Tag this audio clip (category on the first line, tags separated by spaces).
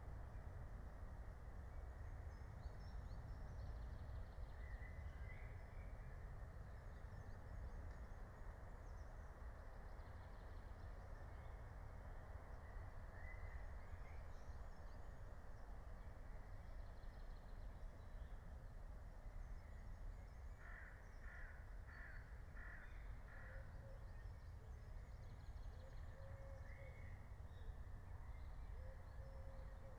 Soundscapes > Nature
meadow field-recording nature natural-soundscape soundscape alice-holt-forest raspberry-pi phenological-recording